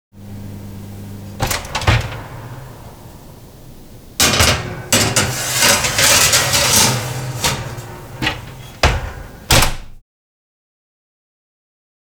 Sound effects > Objects / House appliances
baking-tray-inserting-into-oven
A baking tray sliding onto oven rails. Recorded with Zoom H6 and SGH-6 Shotgun mic capsule.
tray, kitchen, baking, oven